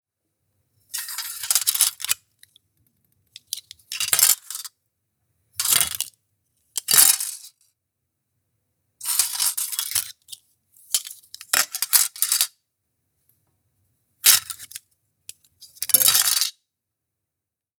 Sound effects > Objects / House appliances
KITCH Cinematis CutleryPlastic PickUpPutDown Several PlateCeramic 02 Freebie
Plastic cutlery tapping on a ceramic plate. This is one of several freebie sounds from my Random Foley | Vol. 3 | Cutlery pack. This new release is all about authentic cutlery sounds - clinks and taps on porcelain, wood, and ceramic.
Cutlery, effects, Foley, Freebie, handling, plastic, PostProduction, recording, SFX, Sound